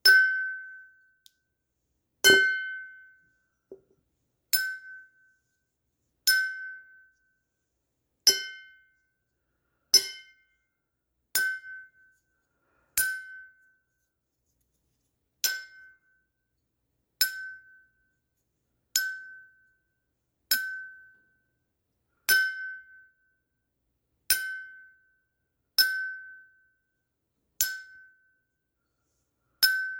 Sound effects > Objects / House appliances
GLASImpt-Samsung Galaxy Smartphone, CU Vase, Dings Nicholas Judy TDC
Glass vase dings.